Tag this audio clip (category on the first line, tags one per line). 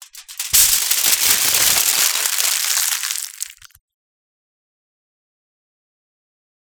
Sound effects > Objects / House appliances
aluminium
foil
kitchen
crumbled
rustle